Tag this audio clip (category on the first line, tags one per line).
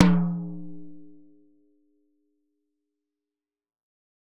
Music > Solo percussion
studio
roll
rimshot
percussion
fill
tomdrum
hitom
drum
perc
rim
beats
beatloop
flam